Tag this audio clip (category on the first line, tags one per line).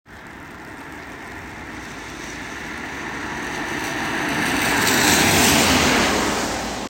Sound effects > Vehicles
car
field-recording
tampere